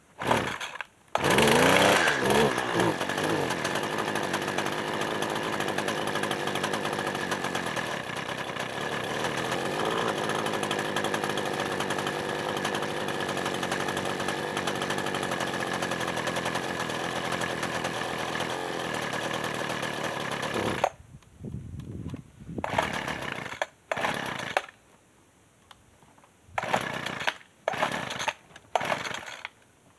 Sound effects > Other mechanisms, engines, machines
Poorly running hedge trimmer. Recorded with my phone.